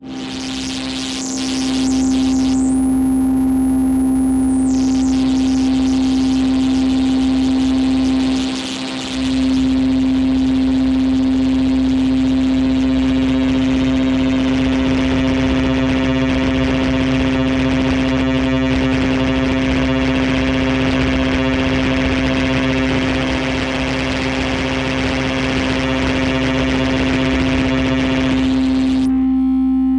Soundscapes > Synthetic / Artificial
Bass Drone Pad 258 Hz with higher freq chaos
a deep dark bassy drone with complex chaos in the upper frequencies, created with multiple analog and digital synths and vsts in FL Studio, processed in Reaper